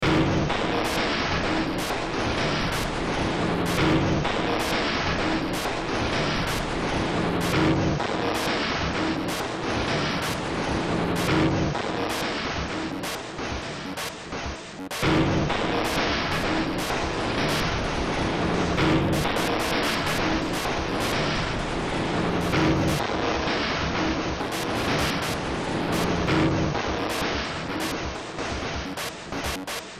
Music > Multiple instruments
Demo Track #3288 (Industraumatic)
Ambient, Cyberpunk, Games, Horror, Industrial, Noise, Sci-fi, Soundtrack, Underground